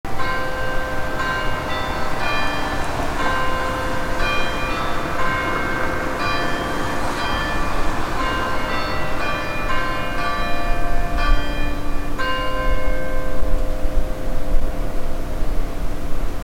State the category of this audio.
Soundscapes > Urban